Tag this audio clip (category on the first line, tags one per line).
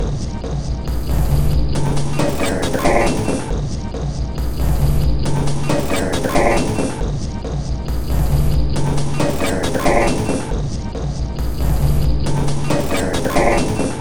Instrument samples > Percussion
Samples
Alien
Drum
Weird
Loop
Packs
Ambient
Soundtrack
Industrial
Underground
Loopable
Dark